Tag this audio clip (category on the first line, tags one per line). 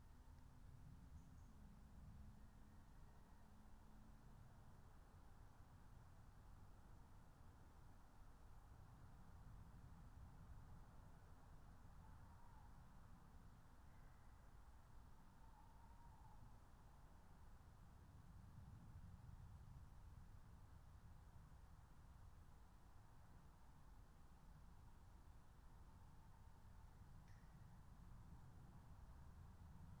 Soundscapes > Nature
alice-holt-forest
natural-soundscape
raspberry-pi
soundscape
phenological-recording
meadow
nature
field-recording